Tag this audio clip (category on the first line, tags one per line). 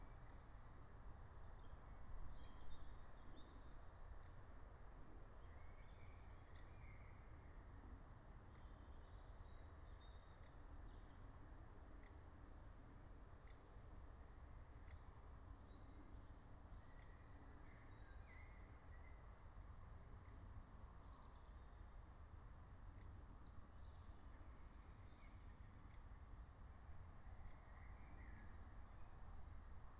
Soundscapes > Nature
meadow
soundscape
natural-soundscape
raspberry-pi
field-recording
alice-holt-forest
nature
phenological-recording